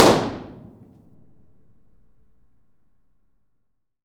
Soundscapes > Indoors
IR / Impulse Response :3 I popped a balloon in a youth club space (Koskikeskus nuorisotila kolo) :D
Balloon, Convolution, Fidelity, High, Impulse, IR, Pop, Response, Reverb, Room, Stereo